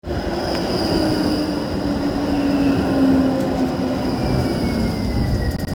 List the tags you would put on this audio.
Soundscapes > Urban
tram streetcar transport

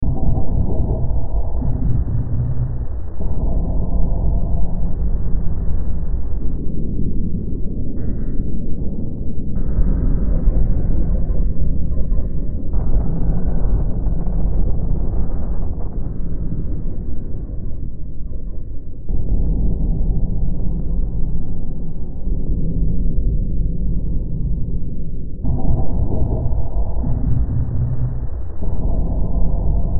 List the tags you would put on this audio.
Soundscapes > Synthetic / Artificial
Ambience Ambient Darkness Drone Games Gothic Hill Horror Noise Sci-fi Silent Soundtrack Survival Underground Weird

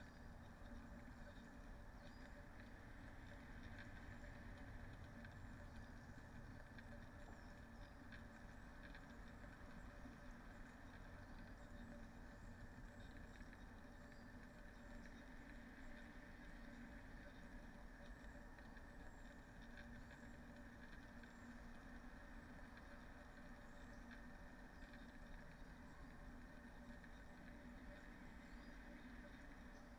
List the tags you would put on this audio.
Soundscapes > Nature
field-recording sound-installation natural-soundscape alice-holt-forest artistic-intervention Dendrophone soundscape

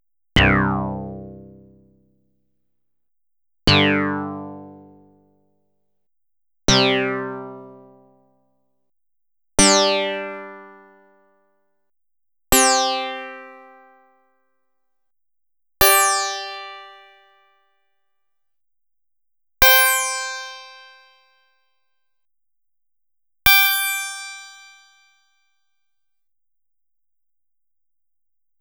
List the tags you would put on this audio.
Instrument samples > Synths / Electronic
Casio Casio-CZ1 CZ1 Phase-Distortion

